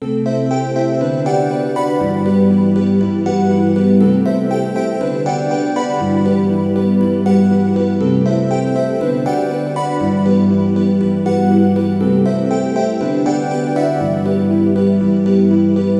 Music > Solo instrument
Ambient Piano Loop #001 Emin 120bpm
Ambient piano loop at 120 bpm in Emin
loop, piano, relax